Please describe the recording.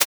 Instrument samples > Synths / Electronic
A shaker one-shot made in Surge XT, using FM synthesis.
fm, synthetic, surge, electronic